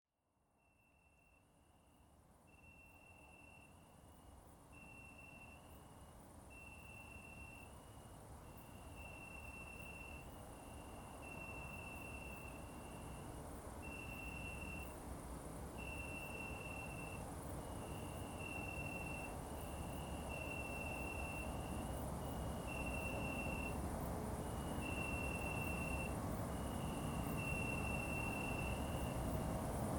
Soundscapes > Urban
1st of September 2025 evening part 2. Recorded by SONY ICD-UX512 Stereo dictaphone.